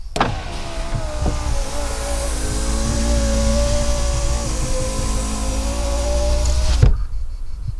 Sound effects > Vehicles
Ford 115 T350 - Window up
A2WS, Mono, France, Tascam, 115, Ford, Ford-Transit, SM57, Van, Single-mic-mono, T350, 2003, Vehicle, 2025, Old, FR-AV2, August, 2003-model